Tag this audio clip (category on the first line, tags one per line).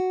Instrument samples > String
cheap
guitar
tone
arpeggio
sound
stratocaster
design